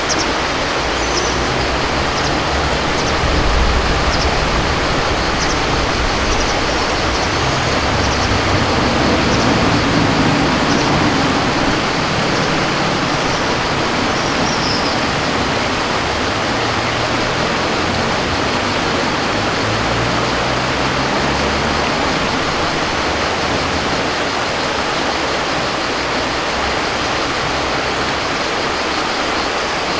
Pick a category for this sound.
Sound effects > Natural elements and explosions